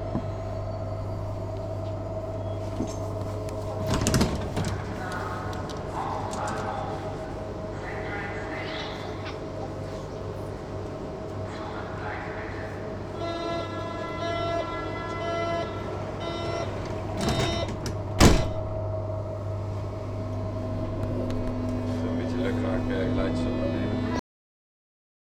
Soundscapes > Urban
Berlin - Metro piep
I recorded this while visiting Berlin in 2022 on a Zoom field recorder.
berlin, public-transport, fieldrecorder, germany, traveling